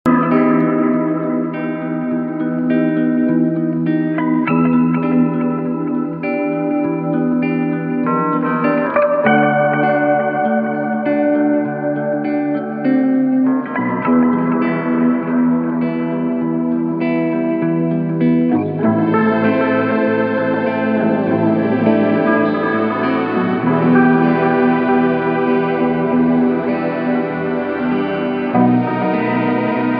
Music > Multiple instruments
Dark moody guitar and synth
Dark cinematic moody synth and guitar music. Gear used: Electric guitar, Bass guitar, synths Abelton Meris enzo synth pedal, line 6 helix, Tc electronics Vibrato, Boss Ge7
Ambient, Dreamscape, Experimental, Horror, Mood